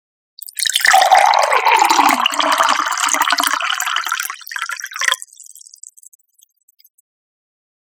Sound effects > Objects / House appliances
pouring-water-into-mug
Water being poured into a ceramic cup. Recorded with Zoom H6 and SGH-6 Shotgun mic capsule.